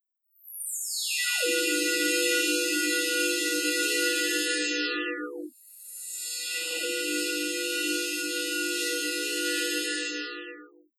Sound effects > Electronic / Design
A Magic Source Made With Pigments and Processed through various GRM plugins, At first I was experimenting With Sci-Fi Engine Sounds and I came up with this along the way, I also panned it with automation to achieve a Rotating sense